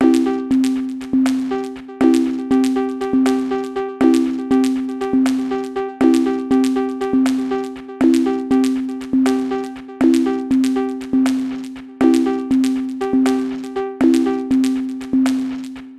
Music > Multiple instruments
Anxious Drum Loop 120bpm #001
This is a drum loop created with my beloved Digitakt 2.
120-bpm, 120bpm, anxious, beat, drum, drum-loop, drums, expectation, loop, neat, percussion, percussion-loop, rhythm, Thermionic